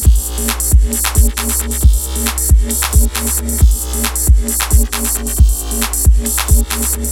Music > Multiple instruments
SHORT BASIC URBAN JUNGLE LOOP 132 BPM
Quick beat made in FL11, basic pattern made out of random percussion samples. Random plugins used on the master buss.
132, bpm, jungle, LOOP, urban